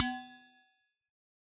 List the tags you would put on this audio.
Sound effects > Objects / House appliances
sampling
percusive
recording

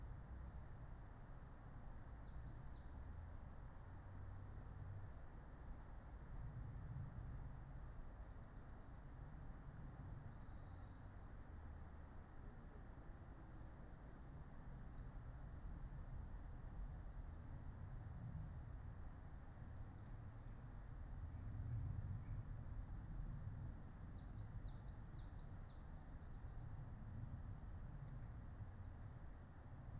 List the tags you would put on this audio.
Soundscapes > Nature
field-recording
nature
weather-data
soundscape
phenological-recording
Dendrophone
modified-soundscape
artistic-intervention
sound-installation
alice-holt-forest
natural-soundscape
data-to-sound
raspberry-pi

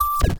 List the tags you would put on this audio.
Sound effects > Electronic / Design
alert
button
digital
interface
menu
notification
options
UI

